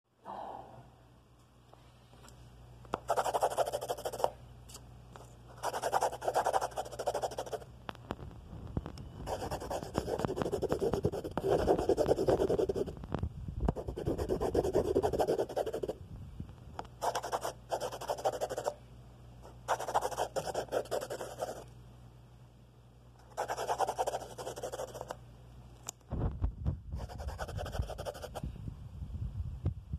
Sound effects > Other
Pen writing on a piece of paper.